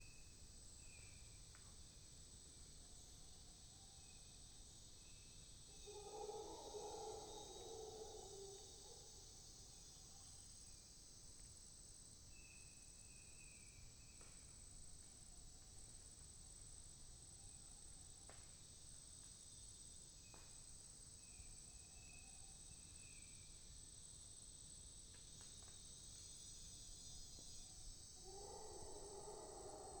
Soundscapes > Nature
Howler monkeys in the forests of South Pacific Costa Rica.